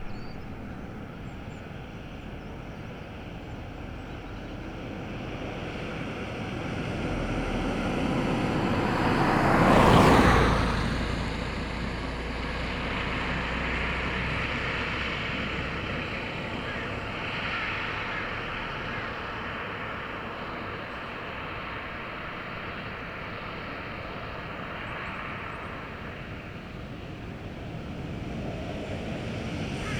Sound effects > Vehicles
Cars drive past on a road.